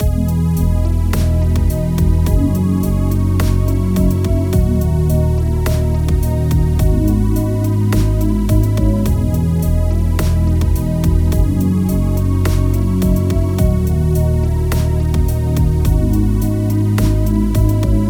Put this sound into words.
Music > Multiple instruments
B major / C# dorian | 106 BPM | 4/4 While creating this loop, I was imagining quiet autumn morning walks. Slightly sleepy, with cool air and that soft morning humidity. C# dorian uses the same notes as B major. Music theory treats them as different modes, but this loop will work perfectly well in compositions written in B major. I’ll be happy to adjust them for you whenever I have time!